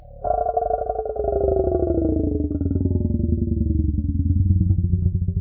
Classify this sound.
Sound effects > Animals